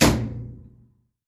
Sound effects > Objects / House appliances
DOORAppl-Samsung Galaxy Smartphone, CU Washing Machine Door, Slam Nicholas Judy TDC
A washing machine door slam.
door, foley, Phone-recording, slam, washing-machine